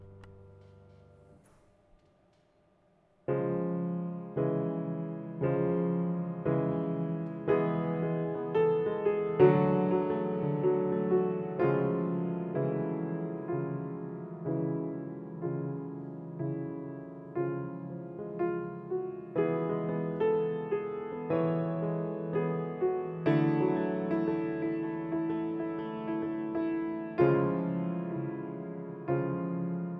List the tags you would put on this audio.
Music > Solo instrument
Ambiance
Harmonica
Talking

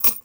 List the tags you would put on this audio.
Sound effects > Other
metallic
change
ring
money

